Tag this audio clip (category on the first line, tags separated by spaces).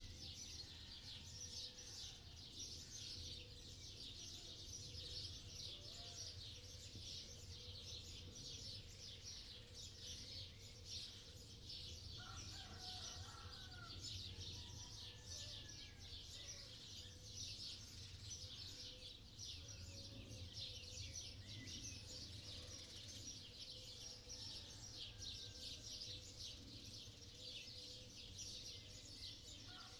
Soundscapes > Nature
nature outdoor birds summer field-recording italy freesound20 ambience dawn